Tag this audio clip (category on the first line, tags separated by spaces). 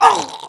Sound effects > Human sounds and actions
Hurt Scream Human